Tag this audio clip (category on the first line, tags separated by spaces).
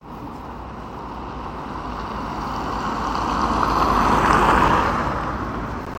Sound effects > Vehicles
road car